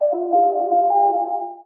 Sound effects > Electronic / Design
Electronic sound, recorded from instruments and edited on audacity.